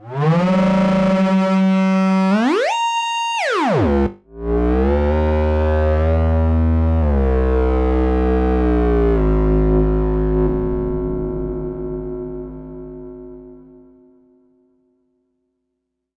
Sound effects > Experimental
Analog Bass, Sweeps, and FX-037
fx, weird, sci-fi, synth, vintage, sfx, oneshot, machine, dark, mechanical, effect, retro, pad, robot, snythesizer, robotic, scifi, alien, basses, sample, electronic, analogue, bassy, bass, korg, analog, sweep, complex